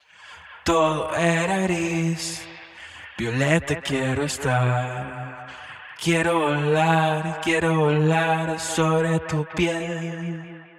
Music > Other
Acapella Spanish Latin America Vocal Sample-89 bpm
dreampop, vocal, ambient, psychedelic, spanish, male, voice, soul, vocalsample, shoegaze, trippy, alternative, neosoul